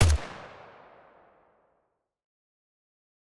Sound effects > Electronic / Design
A rifle firing one-shot designed SFX created with Krotos's Weaponiser. Stereo. 96Khs.
designed, fire, gun, one-shot, riflle, weapon